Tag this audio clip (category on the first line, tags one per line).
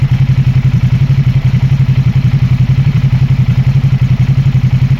Sound effects > Other mechanisms, engines, machines
Motorcycle
Supersport